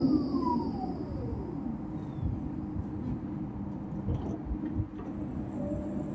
Soundscapes > Urban
final tram 13
finland, tram